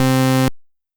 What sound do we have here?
Instrument samples > Synths / Electronic
the title says it all